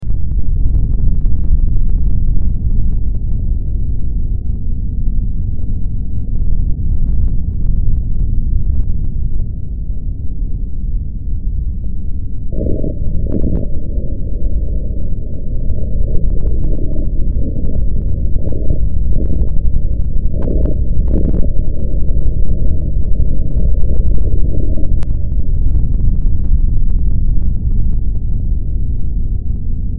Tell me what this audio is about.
Music > Multiple instruments
Demo Track #3193 (Industraumatic)
Industrial, Sci-fi, Cyberpunk, Games, Underground, Noise, Ambient, Soundtrack, Horror